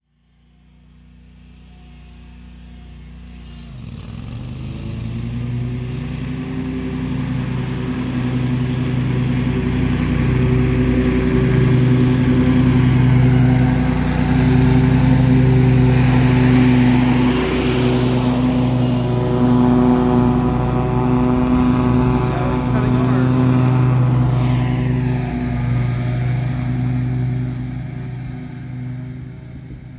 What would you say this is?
Sound effects > Other mechanisms, engines, machines
MACHGrdn-Samsung Galaxy Smartphone, CU Lawnmower, Commercial, Slow Pass By Nicholas Judy TDC
A Scag V-Ride III Fuel Injected lawnmower passing by.
Phone-recording, slow, commercial, lawnmower, pass-by